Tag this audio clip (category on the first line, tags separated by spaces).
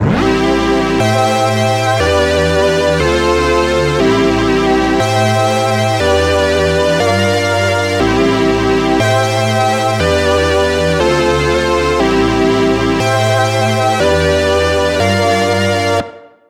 Music > Other
analog cinematic electronic industrial loop melody musical-loop nostalgia retro synth vhs